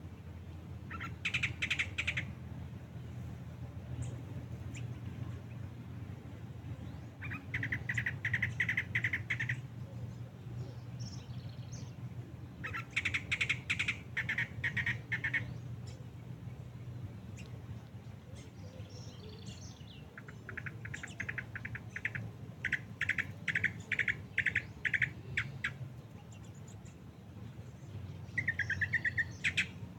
Soundscapes > Nature
Virgin Hill Natural Soundscap – Cerro Ambiente Natural
Natural ambient sound recorded at Cerro de la Virgen, in the city of Talca, Chile. A calm soundscape captured from an elevated area of the city, featuring a subtle blend of wind and birdsong. The recording reflects the tranquility of the place, with delicate sounds that vary according to the intensity of the wind and the presence of local birds. Ambiente registrado en el Cerro de la Virgen, ciudad de Talca (Chile). Sonido ambiente natural capturado en un entorno elevado de la ciudad, donde se percibe una mezcla sutil de viento y pájaros. El registro refleja la tranquilidad del lugar, con sonidos delicados que varían según la intensidad del viento y la presencia de aves locales. agrega eso en ingles